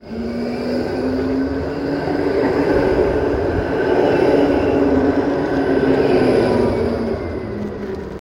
Soundscapes > Urban
Tram passing Recording 18
The sound comes from a tram moving along steel rails, produced mainly by wheel–rail contact, the electric drive, and braking systems. It is characterized by a low-frequency rolling rumble, and rhythmic rail noise with occasional high-pitched braking squeals as the tram passes. The recording was made outdoors near a tram line in Hervanta, Tampere, using recorder in iPhone 12 Pro Max. The purpose of the recording is to provide a clear example of a large electric vehicle pass-by for basic audio processing and movement-related sound analysis.
Trains, Tram, Rail